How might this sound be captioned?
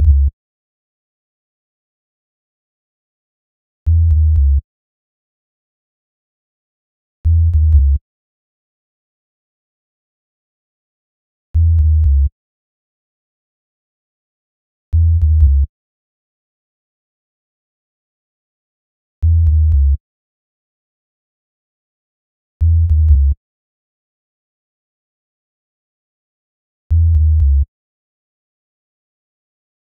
Instrument samples > Synths / Electronic
Hello, I synthesize these sounds in ableton. Use it.